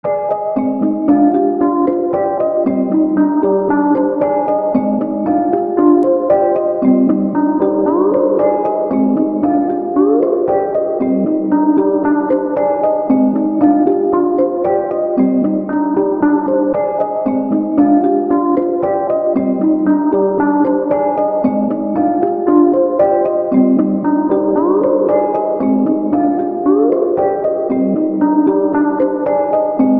Music > Solo instrument
A great melody for you. Bells 115 bpm CMIN